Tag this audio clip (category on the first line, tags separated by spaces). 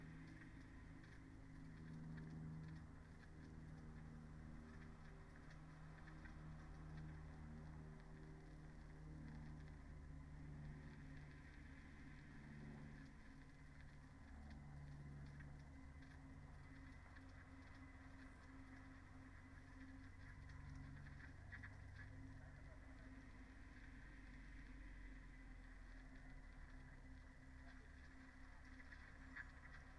Soundscapes > Nature
nature alice-holt-forest natural-soundscape sound-installation data-to-sound soundscape raspberry-pi